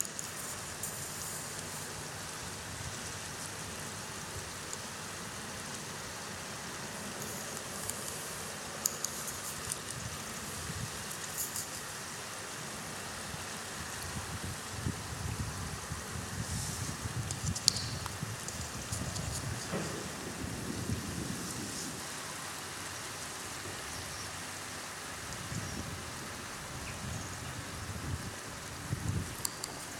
Soundscapes > Nature
Rivier Maas Zachtwater (softwater) River Meuse sample
Recorded during our art residency at the river Meuse at Borgharen Maastricht. We used a Zoom R4 multitrack recorder with use of just the internal microphone. Thank you for listening!